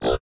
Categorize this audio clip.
Sound effects > Electronic / Design